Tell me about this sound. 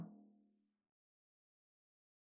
Music > Solo percussion
Floor Tom Oneshot -014 - 16 by 16 inch
perc, oneshot, percs, drumkit, velocity, fill, kit